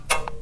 Sound effects > Other mechanisms, engines, machines
Handsaw Oneshot Metal Foley 1
foley, fx, handsaw, hit, household, metal, metallic, perc, percussion, saw, sfx, shop, smack, tool, twang, twangy, vibe